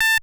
Sound effects > Electronic / Design
CIRCUIT CLICKY ANALOG BLEEP
BEEP, BOOP, CHIPPY, DING, ELECTRONIC, EXPERIMENTAL, HARSH, HIT, INNOVATIVE, OBSCURE, SHARP, UNIQUE